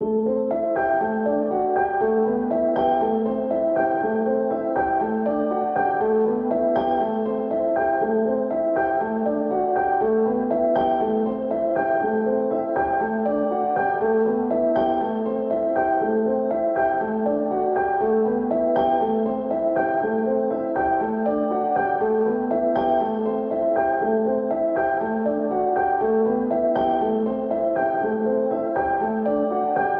Music > Solo instrument
Piano loops 069 efect 4 octave long loop 120 bpm
Otherwise, it is well usable up to 4/4 120 bpm.